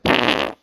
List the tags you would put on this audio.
Sound effects > Other
fart
flatulence
gas

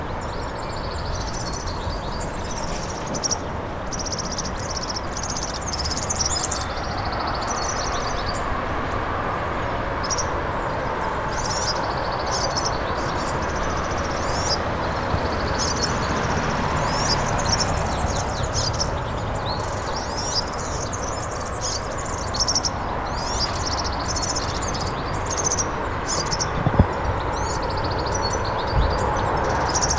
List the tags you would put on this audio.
Sound effects > Animals
MS
Pyrenees-Orientales
South
Southern-France
H2n
2025
Occitanie
France
Zoom
Rivesaltes
Morning
66600
Bird

